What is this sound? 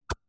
Sound effects > Objects / House appliances
Stickman whoosh movement (Light version)
For animation that have fast movement (object that used for producing this sound: hanger)
whoosh, movement, swoosh, stickman, sfx